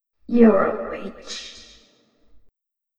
Speech > Processed / Synthetic
Recorded "You're a witch" and distorted with different effects.